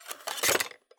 Sound effects > Objects / House appliances
A recording of a bread knife being pulled out of a metal block.
friction, kitchen, knife, metal, pulling-out, slow, unsheathe